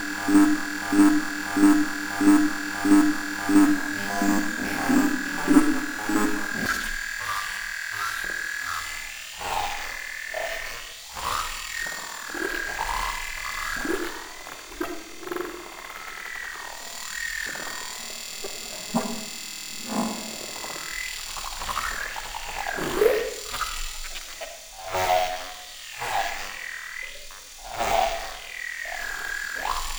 Sound effects > Electronic / Design
Warped wave noise
alien, ambience, analog, bass, creature, creepy, dark, digital, experimental, extraterrestrial, fx, glitch, glitchy, gross, industrial, loopable, machanical, machine, monster, otherworldly, sci-fi, sfx, soundeffect, sweep, synthetic, trippy, underground, warped, weird, wtf